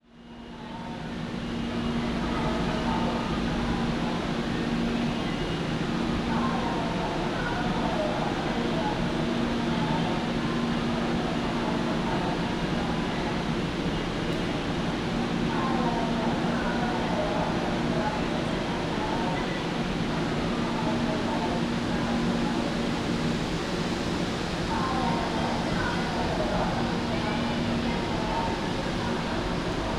Soundscapes > Urban
250806 192901 PH Travelling in MRT through Manila
Traveling in MRT. (metro rail transit) through Manila (binaural, please use headset for 3D effects). I made this binaural recording while travelling in MRT (metro rail transit) through Manila (Philippines), from North Avenue station to Taft Avenue station. First, I’m waiting the train on the platform, and when it arrives, I come in. Then, one can hear passengers chatting, the doors of the train opening and closing, the buzzer when the doors close, voices announcements, and more. At the end of the file, I exit from the train. Recorded in August 2025 with a Zoom H5studio and Immersive Soundscapes EarSight Binaural microphones. Fade in/out and high pass filter at 60Hz -6dB/oct applied in Audacity. (If you want to use this sound as a mono audio file, you may have to delete one channel to avoid phase issues).
station, MRT, people, field-recording, train, Manila, kids, announcements, atmosphere, binaural, passengers, buzzer, platform, noise, women, ambience, Philippines, soundscape, doors, public-transportation, horn, voices, children, noisy, men